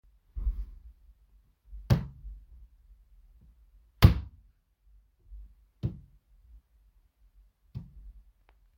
Sound effects > Objects / House appliances
Coupla thuds
A couple of thuds with varied hardness. I needed a wooden thud sound effect and couldnt quite find one that fit so i recorded this on my phone. made by thumping my hand on my dresser. sound isn't isolated, so some background noise is present.
smack, wood